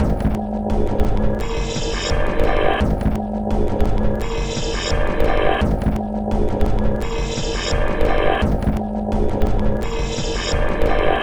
Instrument samples > Percussion
This 171bpm Drum Loop is good for composing Industrial/Electronic/Ambient songs or using as soundtrack to a sci-fi/suspense/horror indie game or short film.
Alien, Weird, Industrial, Packs, Samples, Loop, Soundtrack, Underground, Ambient, Drum, Loopable, Dark